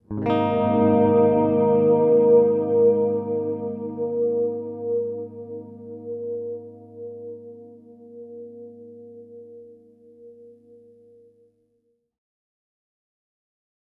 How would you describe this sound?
Instrument samples > String
Baritone Guitar - G# Chord 3 - Reverb
Simple chord played on my G4M electric baritone guitar that is tuned in C. Recorded with Dreadbox Raindrops effects pedal on Zoom AMS-24 audio interface (stereo).
chord, electric, Gsharp, guitar, reverb, stereo, wide